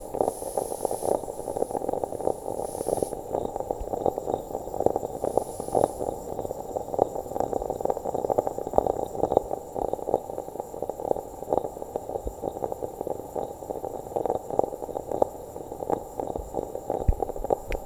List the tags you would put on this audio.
Soundscapes > Indoors
bar Bialetti breakfast coffee espresso field-recording italy machine moka

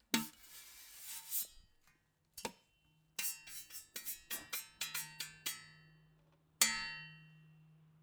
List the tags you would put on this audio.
Sound effects > Objects / House appliances
metallic tool shovel

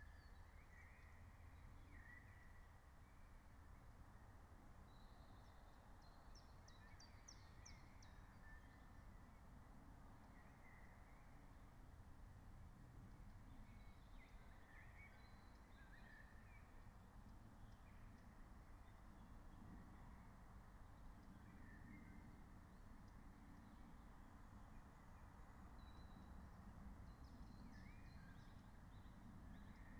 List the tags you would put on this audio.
Soundscapes > Nature
nature soundscape

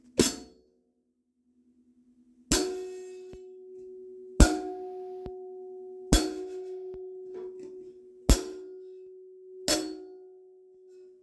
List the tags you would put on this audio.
Instrument samples > Percussion
acoustic drum drum-loop drums garbage groovy hh hihats hit improvised loop percs percussion percussion-loop percussive samples snare solo sticks

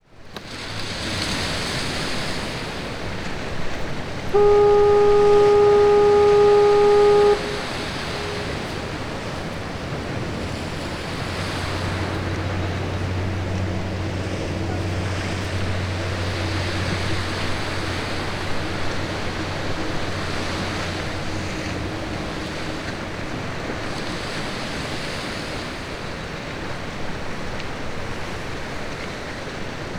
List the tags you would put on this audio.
Soundscapes > Nature
coast harbor field-recording sea beach seagulls Mendocino ocean ambient lighthouse waves rocks boats California wave breakers fishing surf shore Pacific-Ocean splash Fort-Bragg tide Noyo fog-horn water boat seaside harbor-seal coastal

